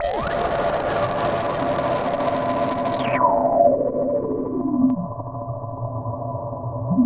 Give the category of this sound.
Sound effects > Electronic / Design